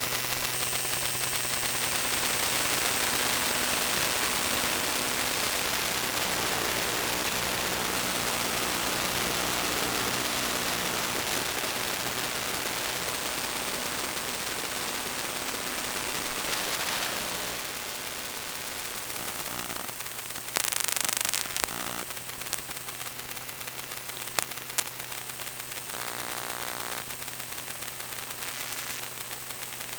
Sound effects > Objects / House appliances
Electromagnetic Field Recording of Nixplay Digital Frame
Electromagnetic field recording of a Nixplay Digital Frame. Electromagnetic Field Capture: Electrovision Telephone Pickup Coil AR71814 Audio Recorder: Zoom H1essential
digital, electric, electrical, electromagnetic, frame